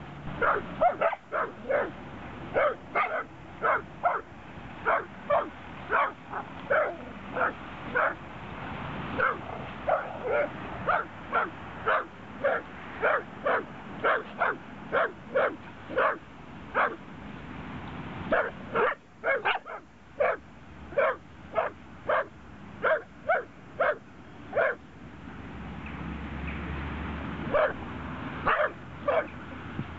Sound effects > Animals
3 dogs playing together in the park barking loudly. Background traffic noises. A sound track from a short video shot by me with iphone 6.